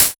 Instrument samples > Percussion
8 bit-Noise Open Hat1
FX, 8-bit, percussion, game